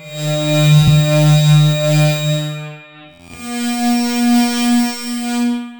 Instrument samples > Synths / Electronic
A odd synth sound, a odd sound created using audacity for anyone to use
chord, sound